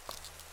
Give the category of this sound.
Sound effects > Human sounds and actions